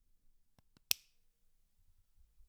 Sound effects > Other mechanisms, engines, machines
Marker click
click, marker, pen